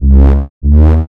Instrument samples > Synths / Electronic
Asym Bass 1 #C
Synthed with phaseplant only, I just use Asym mode to module a sine wave. Processed with Waveshaper. Sometimes I hear it in synthwave, I was always tought that it is a special snare, so I think you can layer it on your kick as a snare too.
snare, Asym, Synthwave, Bass, Analog